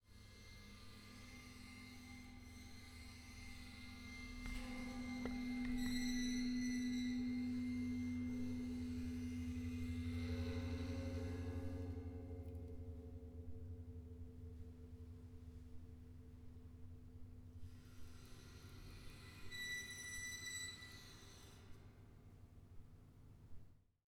Sound effects > Other
Bowing metal stairs with cello bow 4
Bowing the metal part of the staircase in our apartment building. It's very resonant and creepy.
atmospheric, bow, eerie, effect, fx, horror, metal, scary